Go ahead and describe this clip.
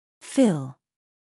Speech > Solo speech
to cook
english, pronunciation, voice, word